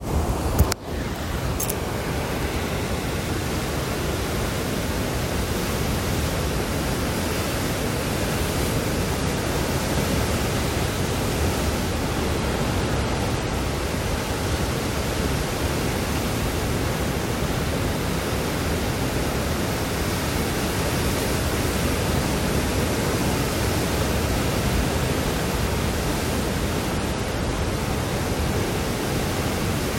Soundscapes > Nature
Sea breaking on shore early evening Escarpa Madeira November 2024
Sea breaking on shore early evening Escarpa Madeira - recorded on iPhone SE
Beach, Evening, Madeira, Ocean, Waves